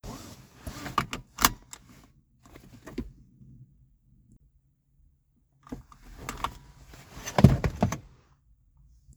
Sound effects > Vehicles
Seatbelt In, Out
Seatbelt being put in, then taken out; Metal clicking and locking, fabric sliding against fabric, objects tumbling. Recorded on the Samsung Galaxy Z Flip 3. Minor noise reduction has been applied in Audacity. The car used is a 2006 Mazda 6A.
car, car-interior, lock, pull, release, safety, seatbelt, vehicle